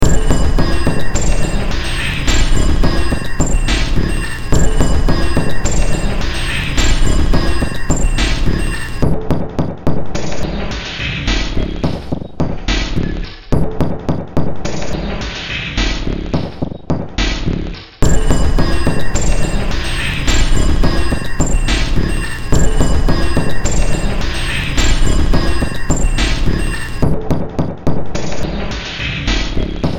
Music > Multiple instruments
Demo Track #3319 (Industraumatic)
Ambient, Cyberpunk, Games, Horror, Noise, Sci-fi, Underground